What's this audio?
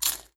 Sound effects > Human sounds and actions
FOODEat-Samsung Galaxy Smartphone Bite into Chip, Doritos Nicholas Judy TDC
Someone eating a Doritos chip.
foley, chip, human, dorito, doritos, eat, Phone-recording